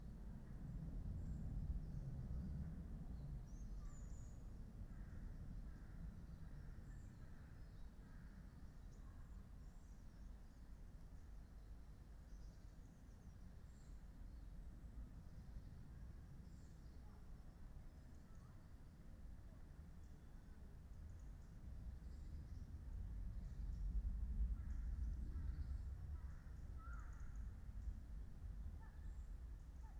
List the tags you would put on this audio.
Soundscapes > Nature
artistic-intervention field-recording modified-soundscape natural-soundscape nature raspberry-pi soundscape weather-data

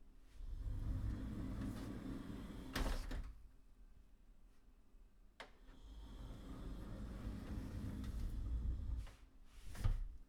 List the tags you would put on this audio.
Sound effects > Objects / House appliances
doors sliding opening

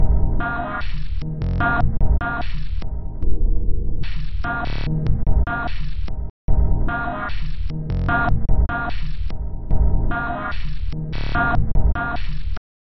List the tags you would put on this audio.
Instrument samples > Percussion
Loop Industrial Weird Packs Underground Drum Alien Samples Dark Ambient Soundtrack Loopable

